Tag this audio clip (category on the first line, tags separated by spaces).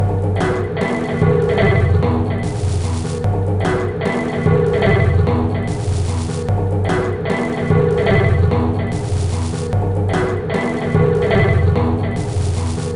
Instrument samples > Percussion
Alien Dark Underground Drum Packs Weird Soundtrack Loop Ambient Industrial Samples Loopable